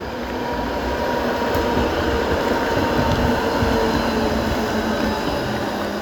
Sound effects > Vehicles
tram-samsung-5
vehicle, outside, tramway